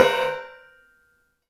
Music > Solo instrument
Cymbal Grab Stop Mute-002
Crash, Custom, Cymbal, Cymbals, Drum, Drums, FX, GONG, Hat, Kit, Metal, Oneshot, Paiste, Perc, Percussion, Ride, Sabian